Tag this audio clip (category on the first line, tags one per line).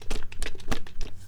Sound effects > Objects / House appliances
clack
click
industrial
plastic
foley
carton